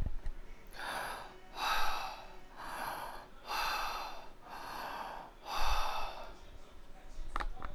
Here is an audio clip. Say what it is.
Sound effects > Human sounds and actions
Breathing in and out